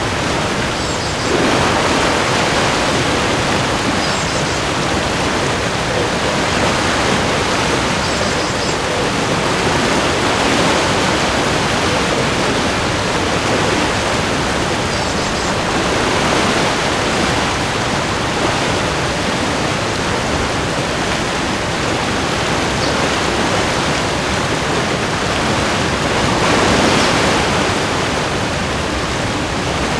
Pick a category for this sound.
Sound effects > Natural elements and explosions